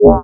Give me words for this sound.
Instrument samples > Synths / Electronic
DISINTEGRATE 4 Ab
additive-synthesis, bass, fm-synthesis